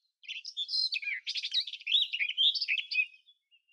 Sound effects > Animals
A recording of a Garden Warbler. Edited using RX11.
recording, nature, Garden, field, warbler, UK, birdsong, Bird